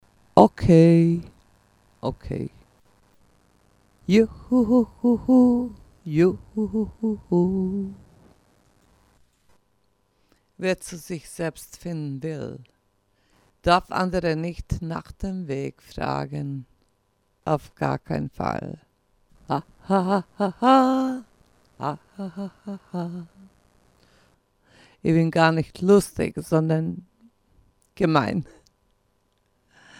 Sound effects > Human sounds and actions
Ich bin gemein -Grerman
female, voice, talk, gernan